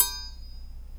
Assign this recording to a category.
Sound effects > Objects / House appliances